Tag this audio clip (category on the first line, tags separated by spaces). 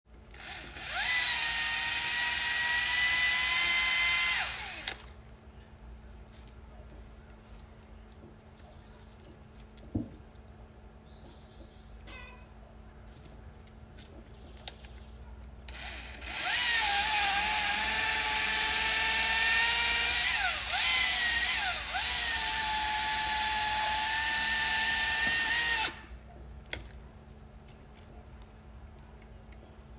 Soundscapes > Nature
chain-saw-electric chain-saw-gas farm